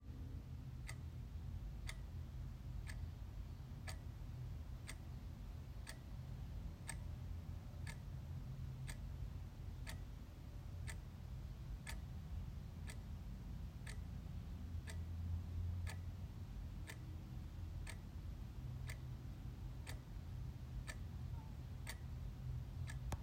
Sound effects > Objects / House appliances
Sound of a vintage, mid-century modern Elgin starburst wall clock ticking.